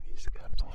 Speech > Processed / Synthetic
Creepy whisper sound Recorded with a Rode NT1 Microphone